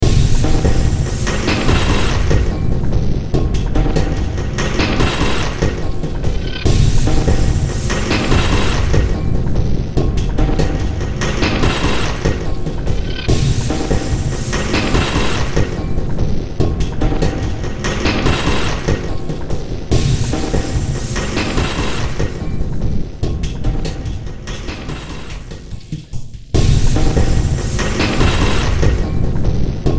Music > Multiple instruments
Demo Track #3572 (Industraumatic)
Cyberpunk, Games, Horror, Industrial, Underground